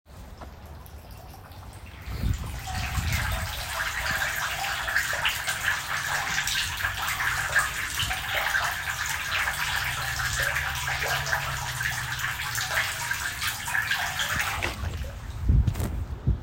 Sound effects > Natural elements and explosions
parking lot drain
recording of water flowing beneath a grate in a parking lot after a rain shower. Recorded from my iphone
drain, rain, water